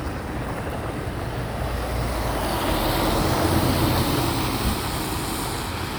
Sound effects > Vehicles
Sound of a bus passing by in Hervanta, Tampere. Recorded with a Samsung phone.